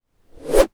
Sound effects > Experimental
Stick - Reverse Whooshes (flying object)
Subject : A whoosh sound made by swinging a stick. Date YMD : 2025 04 21 Location : Gergueil France. Hardware : Tascam FR-AV2, Rode NT5 Weather : Processing : Trimmed and Normalized in Audacity. Fade in/out.
fast, fly-by, Woosh, tascam, swinging, stick, whosh, Transition, Rode, NT5, FR-AV2, SFX, Swing, arrow, whoosh